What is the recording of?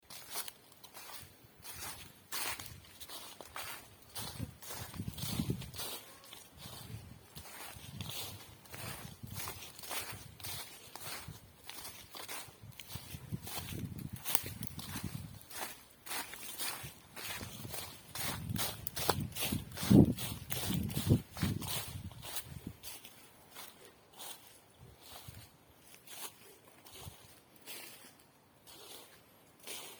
Sound effects > Human sounds and actions
Walking on dry autumn leaves in the garden